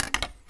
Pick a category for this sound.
Sound effects > Other mechanisms, engines, machines